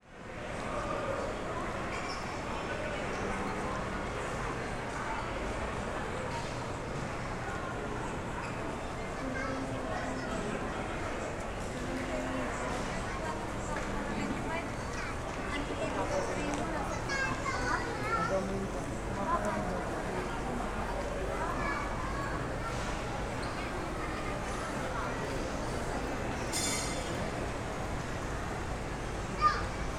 Soundscapes > Indoors
250805 145949 PH Walking Through Mall Of Asia
Walking through the Mall Of Asia (binaural, please use headset for 3d effects). I made this binaural recording while walking through the alleys of one of the building of the Mall of Asia (MOA is one of the biggest malls of Asia, located in Manila, in the Philippines). One can hear the atmosphere of this big crowded mall, with adults and children, music from the stores, restaurants and entertainments. Recorded in August 2025 with a Zoom H5studio and Ohrwurm 3D binaural microphones. Fade in/out and high pass filter at 60Hz -6dB/oct applied in Audacity. (If you want to use this sound as a mono audio file, you may have to delete one channel to avoid phase issues).
adults, ambience, atmosphere, binaural, busy, children, crowd, crowded, field-recording, hubbub, kids, lively, mall, Mall-Of-Asia, Manila, men, MOA, music, noise, noisy, people, Philippines, shop, shopping, shops, soundscape, store, voices, walla, women